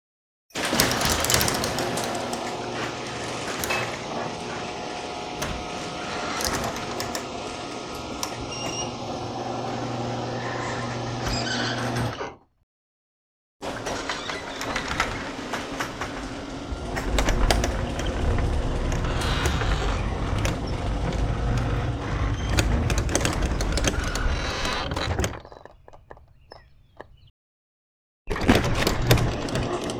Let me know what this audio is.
Sound effects > Other mechanisms, engines, machines
garage door opening and closing exterior and interior 06262025
garage door opening and closing sounds, exterior and interior.
big, car, close, closing, door, doors, exterior, factory, foley, garage, house, household, huge, industrial, interior, machine, machinery, mech, mechanism, motor, open, opening, recordings, shut, slam, vehicle